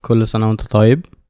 Speech > Solo speech
Wishing you a happy year - In Arabic
My Egyptian housemate saying _ In Arabic.
FR-AV2, Tascam, SM58, freesound20, Arabic, Shure